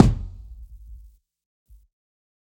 Instrument samples > Percussion
Kick - hard
Heavily processed, lo-fi, crunchy drum sample.